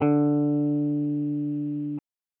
Instrument samples > String
Random guitar notes 001 D3 02
electricguitar, stratocaster, electric, guitar